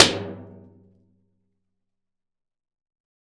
Soundscapes > Other
I&R Tunnel cimetiere Pratgraussal Mid mic n pop (bird flies off)
Impulse-and-response, impulse-response, NT5-o, NT5o